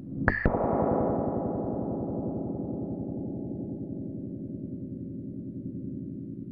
Sound effects > Experimental
Mangling and banging an Aeolian harp in the garden